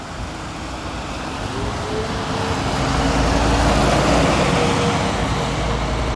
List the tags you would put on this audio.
Soundscapes > Urban
bus
public
transportation
urban

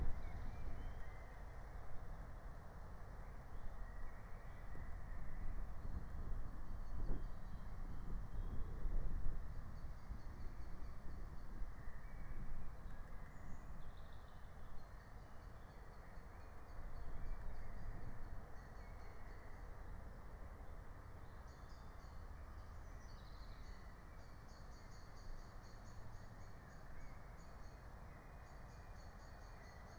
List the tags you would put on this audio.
Soundscapes > Nature
natural-soundscape
soundscape
meadow

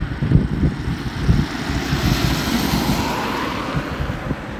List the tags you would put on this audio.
Soundscapes > Urban
city
car
driving
tyres

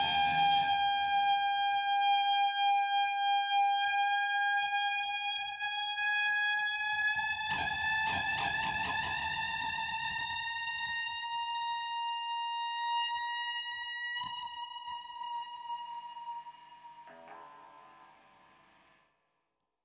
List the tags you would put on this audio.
Music > Other
guitar
riser
techno

